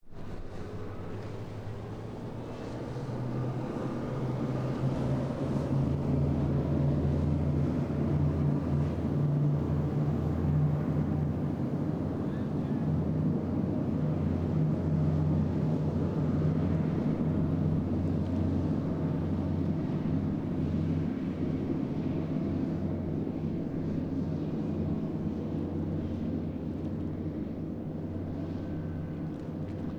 Soundscapes > Other
pneumatic boat going away
pneumatic boat with 600cc engine is going away. Then we discover the lapping on the concrete pier.